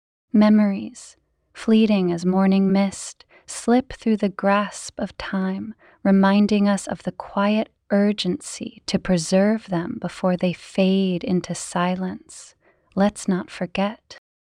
Speech > Solo speech
Memories Poem 2
A short poem about the need to preserve memories
female, human, memories, poem, vocal, voice